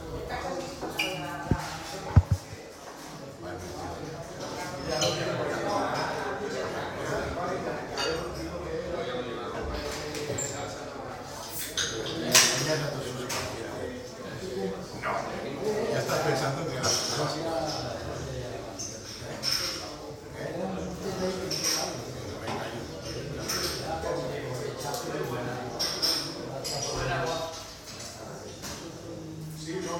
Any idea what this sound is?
Soundscapes > Indoors
This audio contains sounds inside a bar like conversations ,speeches, forks,glasses.